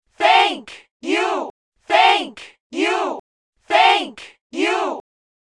Speech > Conversation / Crowd
Thank you Crowd Chant
A crowd chanting "Thank you" three times. My voice recorded with a Shure M58 and processed in Logic Pro.
Chanting, Crowd, Thankyou